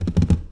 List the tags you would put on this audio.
Sound effects > Objects / House appliances
bucket carry clang clatter cleaning container debris drop fill foley garden handle hollow household kitchen knock lid liquid metal object pail plastic pour scoop shake slam spill tip tool water

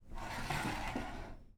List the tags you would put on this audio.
Sound effects > Objects / House appliances
spin chair rotate

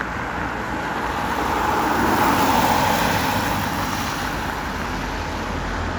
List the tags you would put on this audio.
Soundscapes > Urban
field-recording Car Drive-by

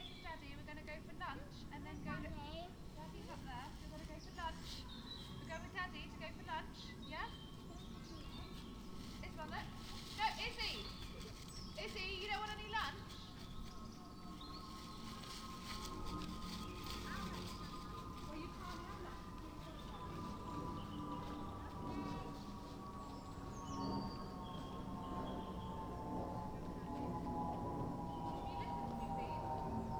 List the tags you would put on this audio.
Soundscapes > Nature
modified-soundscape Dendrophone natural-soundscape

